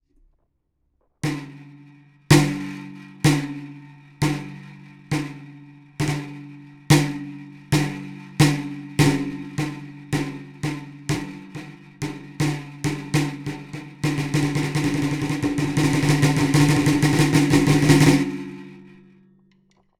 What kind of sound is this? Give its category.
Instrument samples > Percussion